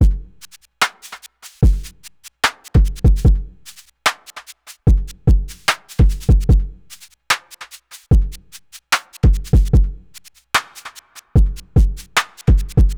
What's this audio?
Music > Solo instrument
All sounds from analogRTYM MK1 at 74BPM The kit was made using kick, clap, snare and woodblocks. The hi hat sound was made using the noise generator machine on the hi hat channel of the analogRTYM. The patterns were programmed in the analogRTYM, triggered via octatrack and recorded in a flex track inside the Octatrack MK2 with little to no processing
808, clap, Crisp, drum, drummachine, DUB, Elektron, FOOTWORK, machine, RIM, RTYM, TECH